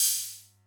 Instrument samples > Percussion
plato casiotone
Sampleando mi casiotone mt60 con sus sonidos de percusión por separado Sampling my casiotone mt60 percusion set by direct line, sparated sounds!